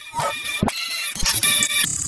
Sound effects > Experimental
Gritch Glitch snippets FX PERKZ-017
alien, glitchy, hiphop, lazer, perc